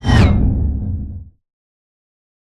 Sound effects > Other
Sound Design Elements Whoosh SFX 010
ambient, audio, cinematic, design, dynamic, effect, effects, element, elements, fast, film, fx, motion, movement, production, sound, sweeping, swoosh, trailer, transition, whoosh